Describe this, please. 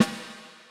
Music > Solo percussion
Snare Processed - Oneshot 224 - 14 by 6.5 inch Brass Ludwig
kit, hits, rim, acoustic, snareroll, sfx, crack, flam, ludwig, brass, realdrum, processed, drum, oneshot, hit, realdrums, rimshot, percussion, drumkit, snares, roll, fx, snare, beat, drums, rimshots, perc, snaredrum, reverb